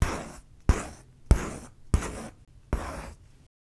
Sound effects > Objects / House appliances
Pencil aggressive (inwards)
Pencil scribbles/draws/writes/strokes aggressively inward.
draw, pencil